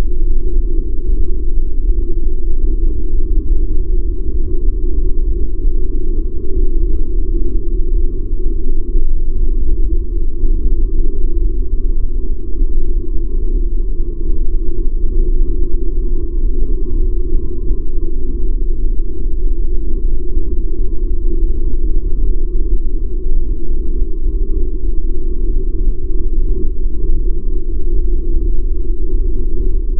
Sound effects > Experimental
"Standing at the void's edge reassured me I was alone once again." For this audio, I originally captured ambient sound from inside my home using a handheld recorder. Then I produced the final media file using Audacity.
chasm, deep, lonely, windy